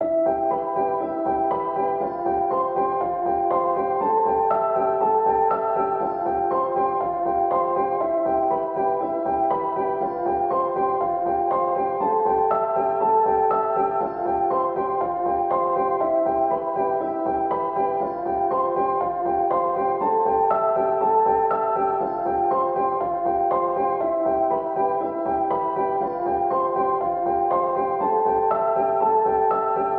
Music > Solo instrument
Piano loops 082 efect 4 octave long loop 120 bpm
120bpm, reverb, music, free, loop